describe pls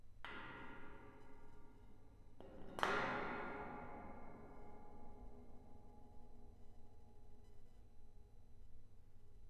Sound effects > Objects / House appliances
A glass bottle being scraped against a metal staircase. Recorded with a Zoom H1.